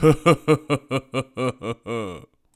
Sound effects > Human sounds and actions
fake laugh
laugh, male